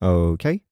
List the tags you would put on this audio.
Speech > Solo speech
2025
Adult
Calm
FR-AV2
Generic-lines
hesitant
Hypercardioid
july
Male
mid-20s
MKE-600
MKE600
ohh-kay
Sennheiser
Shotgun-mic
Shotgun-microphone
Single-mic-mono
Tascam
VA
Voice-acting

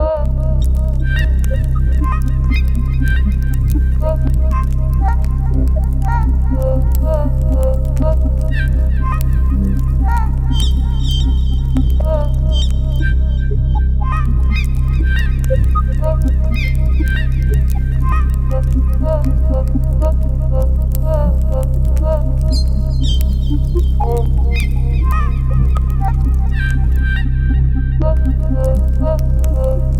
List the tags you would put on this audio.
Soundscapes > Synthetic / Artificial
vocal
ambient
voices
fire
voice
granular
human
torso
torso-s4

